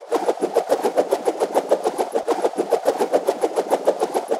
Sound effects > Electronic / Design
A Rope Spin, Based on some Cowboy References, designed with Pigments via Studio One
Rope, Spin, SoundEffect